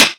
Instrument samples > Percussion
hi-hatized crash Sabian low-pitched 1 short
It's a bass hi-hat based on a re-enveloped crash file. closed-hi-hatized namesake crash (search my crash folder) I drew the waveform's envelope on WaveLab 11. tags: hi-hat minicymbal picocymbal click metal metallic tick bronze brass cymbal-pedal drum drums percussion hat hat-cymbal closed-hat closed-cymbals chick-cymbals hat-set snappy-hats facing-cymbals dark crisp dark-crisp Zildjian Sabian Meinl Paiste Istanbul Bosporus
percussion, Meinl, Istanbul, tick, hat-cymbal, hat, Paiste, picocymbal, drum, closed-cymbals, metal, snappy-hats